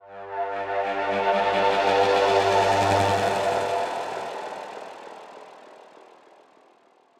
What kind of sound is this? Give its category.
Sound effects > Electronic / Design